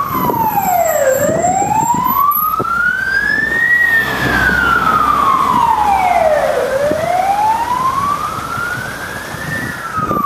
Sound effects > Other mechanisms, engines, machines
Ambulance is on its way. This sound was recorded by me using a Zoom H1 portable voice recorder.